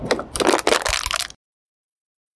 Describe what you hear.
Sound effects > Objects / House appliances
Stepping on plastic bottle
recorded on: hyperx quadcast edited on: audacity, noise cancelling and trimming i recorded this at my university garden -alara kanat